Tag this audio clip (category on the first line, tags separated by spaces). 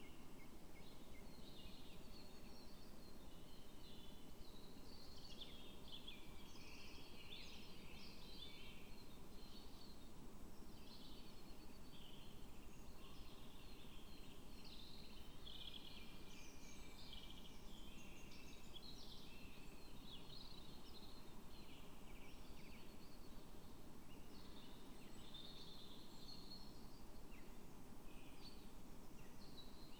Soundscapes > Nature
natural-soundscape artistic-intervention phenological-recording alice-holt-forest soundscape sound-installation Dendrophone raspberry-pi field-recording nature data-to-sound weather-data modified-soundscape